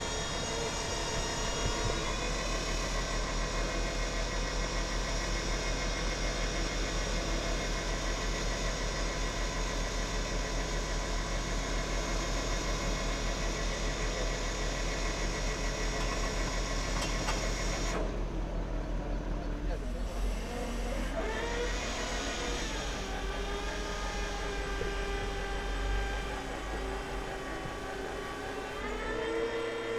Soundscapes > Urban
hoisting and turning postboat foula harbour
postboat from the island of Foula is hoisted on land
harbour, hoisting, mechanical